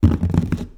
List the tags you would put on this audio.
Sound effects > Objects / House appliances
kitchen pour cleaning clang drop knock slam debris pail spill tool water lid foley container household plastic liquid fill garden metal clatter tip handle bucket object hollow shake scoop carry

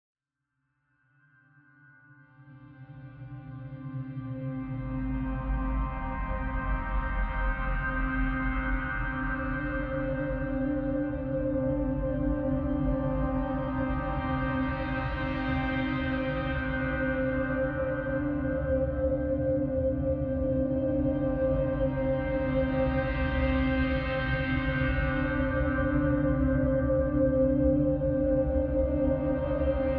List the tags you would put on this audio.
Music > Multiple instruments
Ambiance Atmospheric Background emotional Loop Melancholy Music reflective sad Sound-Design

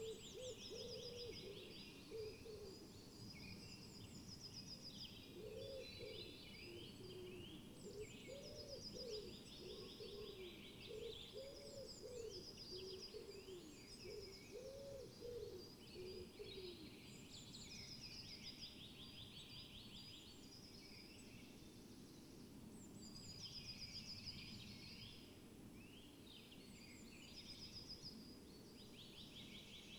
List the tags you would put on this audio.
Soundscapes > Nature
alice-holt-forest field-recording modified-soundscape natural-soundscape nature phenological-recording raspberry-pi soundscape weather-data

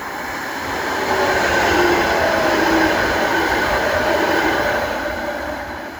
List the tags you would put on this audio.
Soundscapes > Urban
Tram field-recording Drive-by

